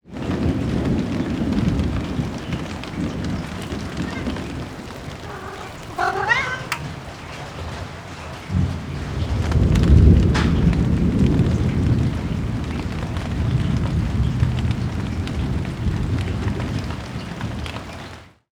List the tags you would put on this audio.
Soundscapes > Nature
rain
moaning
moan
storm
weather
purr

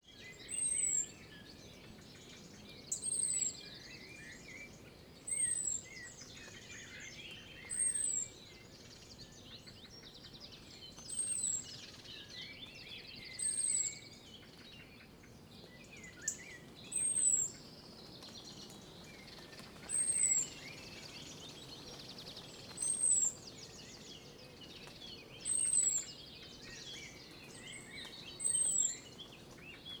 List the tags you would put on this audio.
Soundscapes > Nature
bird
birds
birdsong
Britany
countryside
field-recording
France
nature
spring

